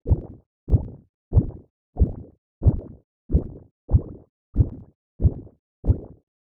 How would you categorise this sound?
Sound effects > Other